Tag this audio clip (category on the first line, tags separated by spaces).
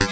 Sound effects > Electronic / Design
Effect FX Glitch One-shot